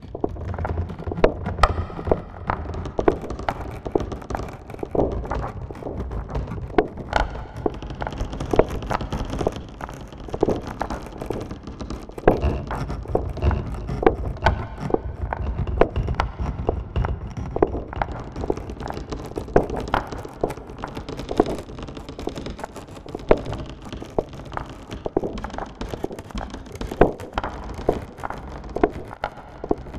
Soundscapes > Synthetic / Artificial
Tape loop with reverb out of a creaky old wood sound
old, reverb, tape-loop